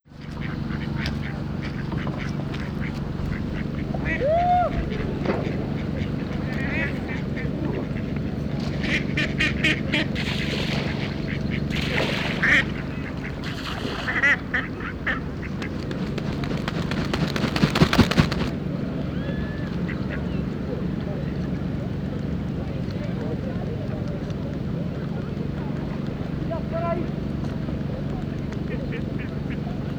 Soundscapes > Nature
054 DMBPARK DUCKS WATER WING-FLAP
Sounds recorded between Jardim Botânico and Convento de São Francisco (Coimbra, Portugal, 2018). Recorded with Zoom H4n mk1, using either built-in mics, Røde shotgun (I forgot which model...) and different hydrophones built by Henrique Fernandes from Sonoscopia.
park, water, ducks, wing-flap